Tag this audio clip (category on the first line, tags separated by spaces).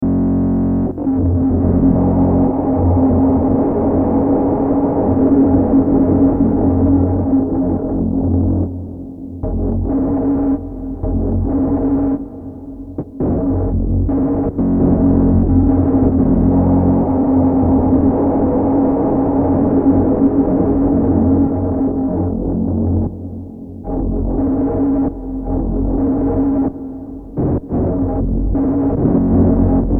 Soundscapes > Synthetic / Artificial
Ambience Ambient Darkness Drone Games Gothic Hill Horror Noise Sci-fi Silent Soundtrack Survival Underground Weird